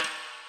Music > Solo percussion
Snare Processed - Oneshot 161 - 14 by 6.5 inch Brass Ludwig
flam, fx, kit, crack, oneshot, brass, rimshot, beat, snaredrum, drum, hit, snareroll, perc, drumkit, snare, drums, processed, reverb, rimshots, percussion, hits, ludwig, rim, snares, acoustic, roll, sfx, realdrum, realdrums